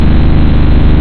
Instrument samples > Synths / Electronic
Bit crushed bass, a bass sound created in audacity for you use in any project
bitcrushed, sample